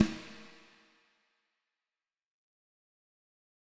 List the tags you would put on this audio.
Music > Solo percussion
snareroll roll crack beat snare sfx hit snares reverb kit rimshot fx rim perc acoustic hits processed drumkit oneshot percussion rimshots drums realdrum brass ludwig realdrums snaredrum flam drum

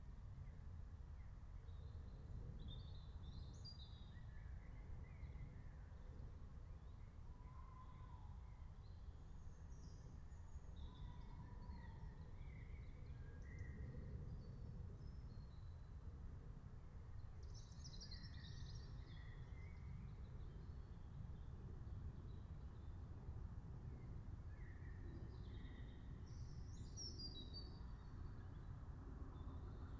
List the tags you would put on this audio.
Soundscapes > Nature
phenological-recording alice-holt-forest nature meadow natural-soundscape raspberry-pi soundscape

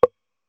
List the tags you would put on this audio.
Sound effects > Electronic / Design
interface ui game

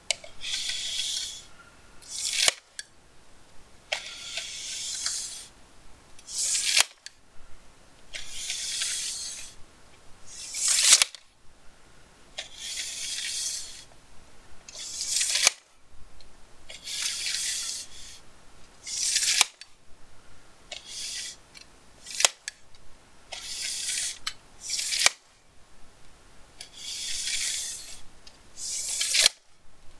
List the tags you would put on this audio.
Sound effects > Objects / House appliances
Blue-brand Blue-Snowball extend foley retract set-down tape-measure

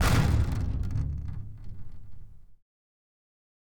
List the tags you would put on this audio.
Sound effects > Natural elements and explosions
Punchline Comedy Drama Cinematic Boom Impact Trailer

